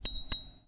Sound effects > Vehicles
My own recording, recorded on a zoom box